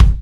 Instrument samples > Percussion
kick Tama Silverstar Mirage 22x16 inch 2010s acryl bassdrum - kicktrig 1
headwave, headsound, non-electronic, natural, percussion, jazzdrum, mainkick, trigger, non-overtriggered, rock